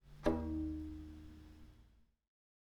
Instrument samples > String
Plucking broken violin string 4
Plucking the string(s) of a broken violin.
string, strings, pluck, violin, unsettling, horror, beatup, creepy